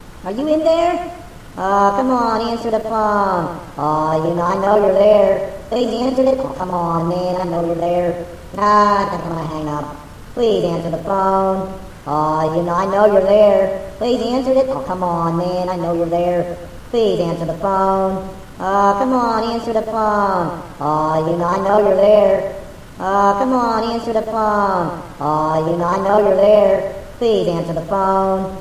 Speech > Other
Please answer the phone please
Remember when you keep hearing the phone ring and you start to get frustrated...then I thought I would record my voice and alter it to sound strange...lol